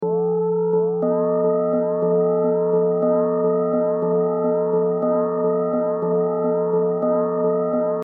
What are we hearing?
Sound effects > Electronic / Design
Sci-Fi/Horror Alarm 1
Sounds dystopian, like something from Half-Life 2.
horror, eerie, hl2, alarm, warning, effect, half-life, fx, sci-fi, space, alert